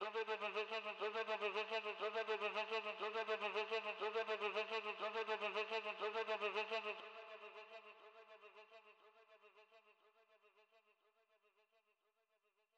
Speech > Other
vocal guitar beat
screech, squall, yell